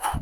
Sound effects > Other
A very quick whooshing sound made by something rapidly passing through the air. Recorded on my tablet with wavepad free.
whooshing, Air, Whoosh